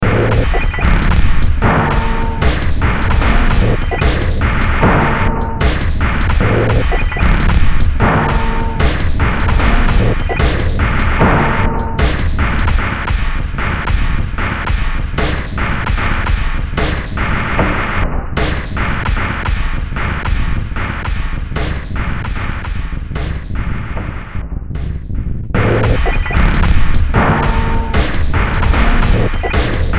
Music > Multiple instruments
Demo Track #3827 (Industraumatic)
Ambient, Cyberpunk, Games, Horror, Industrial, Noise, Sci-fi, Soundtrack, Underground